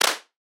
Instrument samples > Synths / Electronic
Clap one-shot made in Surge XT, using FM synthesis.
synthetic, fm, electronic